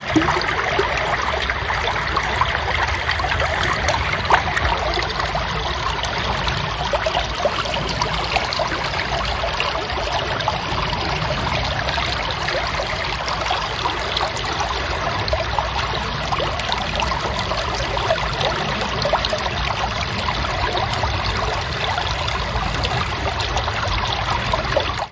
Sound effects > Natural elements and explosions
iPhone 16 stereo recording of creek